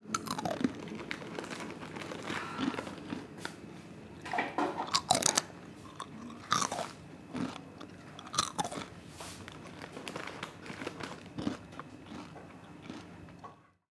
Sound effects > Human sounds and actions
Comiendo nachos
Effect of someone eating nachos.